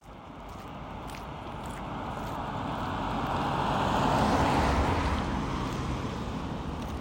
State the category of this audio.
Soundscapes > Urban